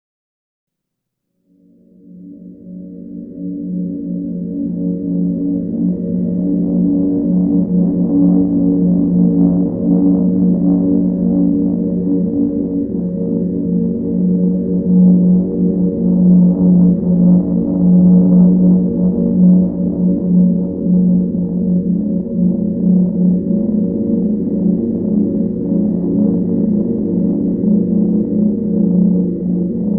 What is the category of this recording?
Sound effects > Electronic / Design